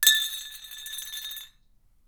Sound effects > Other mechanisms, engines, machines
metal shop foley -228
bam, bang, bop, fx, little, perc, percussion, sound, strike, tink, tools